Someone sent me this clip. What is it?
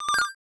Sound effects > Electronic / Design
SERVER SYNTHETIC GLOSSY BLEEP
HIT, BEEP, INNOVATIVE, ELECTRONIC, EXPERIMENTAL, SYNTHETIC